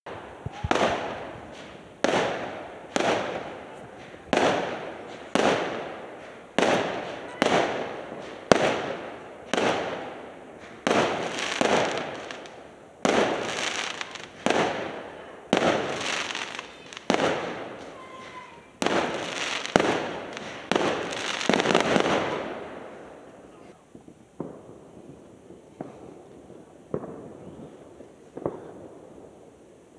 Soundscapes > Urban
Fireworks going off in Nõmme, Tallinn, Estonia. Originally recorded on January 1st at approximately 0:00-1:00 (12pm-1am). Firstly you can hear close fireworks and later distant ones.
bang, distant, explosion, firework, fireworks, new-year, pyrotechnics, rocket